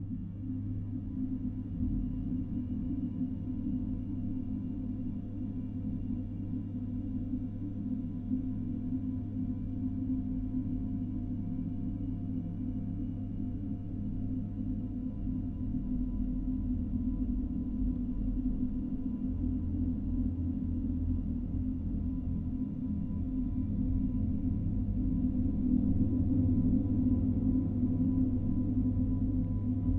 Soundscapes > Synthetic / Artificial
Dark Drone 1
Recorded with LOM Geofon on a Zoom H6, frigde and a metallic heater.
DARK, DRONE, LOM